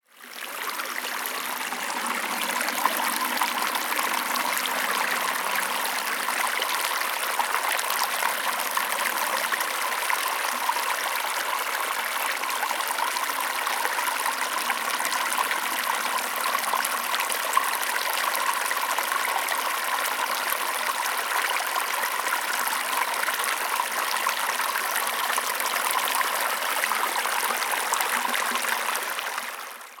Soundscapes > Urban
Ambient sound of water running in Canal Pedro Velez, in the city of Santa Cruz de la Sierra, Bolivia.